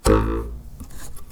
Sound effects > Other mechanisms, engines, machines
Woodshop Foley-035
perc, sound, fx, sfx, knock, strike, metal, bop, tools, oneshot, foley, pop, crackle, bang, tink, bam, wood, boom, thud, shop, percussion, little, rustle